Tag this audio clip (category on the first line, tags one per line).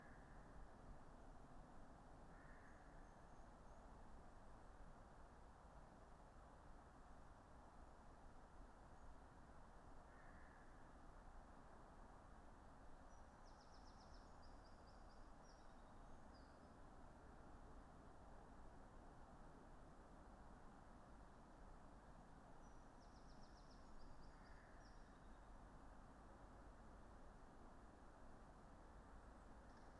Soundscapes > Nature
modified-soundscape
nature
phenological-recording
sound-installation
weather-data